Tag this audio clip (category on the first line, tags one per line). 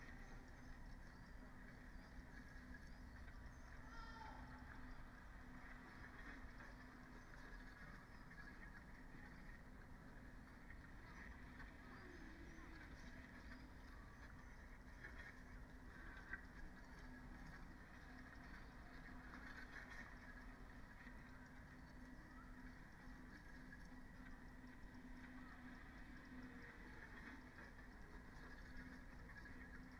Soundscapes > Nature
nature
data-to-sound
soundscape
field-recording